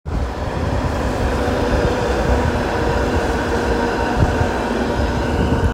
Sound effects > Vehicles
tram-apple-3
Recording of a tram (Skoda ForCity Smart Artic X34) near a roundabout in Hervanta, Tampere, Finland. Recorded with an iPhone 14.
outside, tramway, vehicle, tram